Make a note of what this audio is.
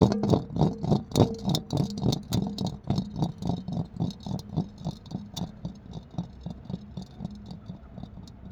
Soundscapes > Indoors
I shacked the earthen pot on hard surface and pot started waving and moving both side.